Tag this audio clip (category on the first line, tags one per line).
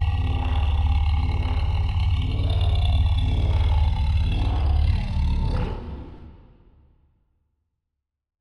Sound effects > Experimental
Sounddesign
Snarling
Monstrous
Groan
Vocal
gutteral
sfx
evil
fx
Reverberating
gamedesign
Growl
Frightening
Ominous
Echo
Sound
Snarl
scary
boss
Deep
Vox
Alien
Otherworldly
Fantasy
demon
Creature
devil
Animal
visceral
Monster